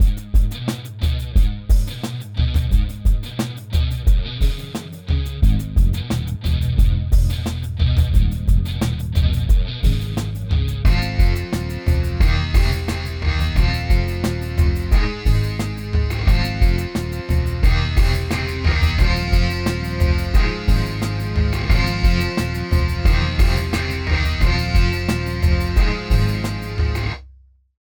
Music > Multiple instruments
177bpm pls send me a link to your work so i can admire it
beat, guitar